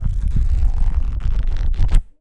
Sound effects > Objects / House appliances
book turning
sound of turning pages. Recorded by myself on a Zoom Audio Recorder.
pages, book, flipping, flip